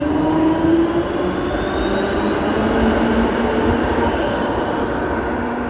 Sound effects > Vehicles
tram-apple-11
outside, tram, vehicle, tramway